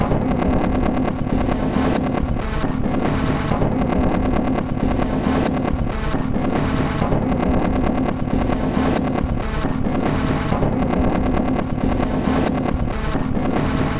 Instrument samples > Percussion
This 137bpm Drum Loop is good for composing Industrial/Electronic/Ambient songs or using as soundtrack to a sci-fi/suspense/horror indie game or short film.
Loop, Dark, Packs, Loopable, Underground, Industrial, Drum, Soundtrack, Weird, Alien, Samples, Ambient